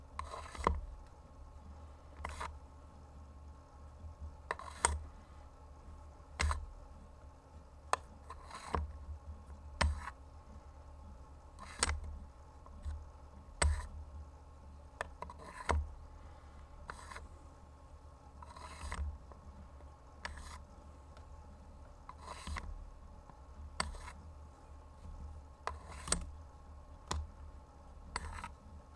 Sound effects > Objects / House appliances
FOLYProp-Blue Snowball Microphone, MCU Pencil, Put In, Out of Pencil Sharpener Hole Nicholas Judy TDC
Putting a pencil in and out of pencil sharpener hole.
Blue-brand, Blue-Snowball, foley, hole, pencil, pencil-sharpener, put